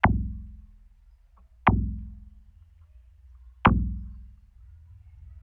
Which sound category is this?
Sound effects > Objects / House appliances